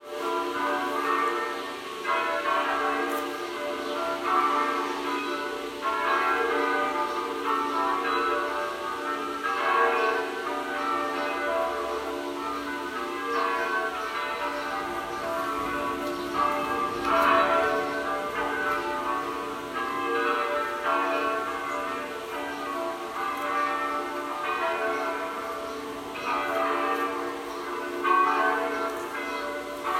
Soundscapes > Urban
Splott - Cardiff Oratory Bells Peel - Swinton Street
fieldrecording, wales, splott